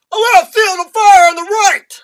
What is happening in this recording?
Speech > Solo speech
Soldier giving command